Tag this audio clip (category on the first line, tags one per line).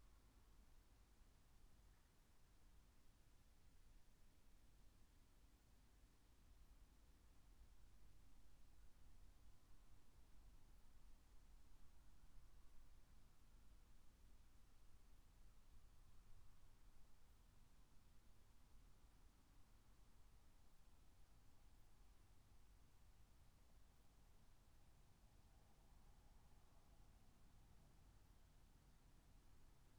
Soundscapes > Nature
field-recording
meadow
soundscape
nature
raspberry-pi
alice-holt-forest
natural-soundscape
phenological-recording